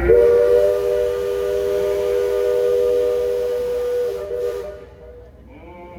Sound effects > Vehicles
Long Steamboat Whistle
Steamboat whistle, recorded on deck of the boat. Recorded from an iPhone 14 pro.
steam-boat, whistle, steamboat, boat, whistling